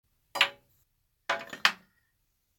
Sound effects > Objects / House appliances
Click-clack 01
I recorded as I put a hard item into a glass shelf in the bathroom. - Quality: bit of reverb from the lack of sound-proofing.
bathroom, bottle, clack, clatter, click, clicking, clicks, glass, noise